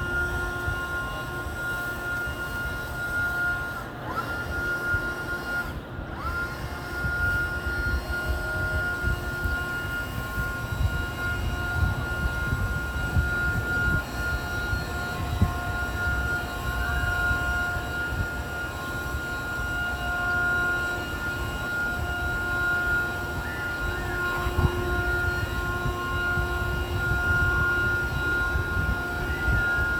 Soundscapes > Urban
electric leaf blower in quad
leaf-blower, electric, general-noise, background-noise